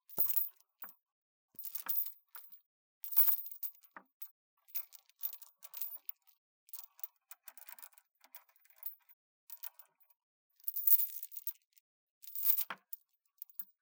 Sound effects > Objects / House appliances
chains foley
Chains being moved slightly, creating a weak tinkling sound
warehouse, foley, chain, metal